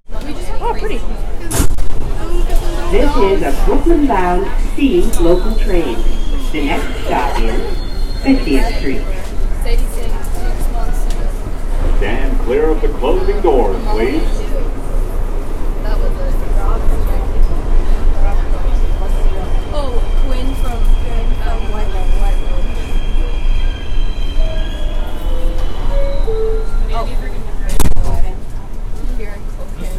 Soundscapes > Urban
New York Subway C Line
Subway Announcement and movement in New York.
Announcement, NewYork, Underground